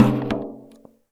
Music > Solo instrument
Tom Tension Pitch Change Tap Perc-004
Recording from my studio with a custom Sonor Force 3007 Kit, toms, kick and Cymbals in this pack. Recorded with Tascam D-05 and Process with Reaper and Izotope